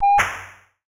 Sound effects > Electronic / Design
CHIPPY ARTIFICIAL UNIQUE ERROR
BEEP, BOOP, CHIPPY, CIRCUIT, COMPUTER, DING, ELECTRONIC, EXPERIMENTAL, HARSH, HIT, INNOVATIVE, OBSCURE, SHARP, SYNTHETIC, UNIQUE